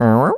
Speech > Solo speech
Villager HUwU 1
Subject : A mid20s male voice-acting for the first time. Check out the pack for more sounds. Doing some "villager" type accent. Weather : Processing : Trimmed and Normalized in Audacity, Faded in/out. Notes : I think there’s a “gate” like effect, which comes directly from the microphone. Things seem to “pop” in. Also sorry my voice-acting isn’t top notch, I’m a little monotone but hey, better than nothing. I’l try to do better and more pushed acting next time ;) Tips : Check out the pack!
Neumann, Cute, Video-game, dialogue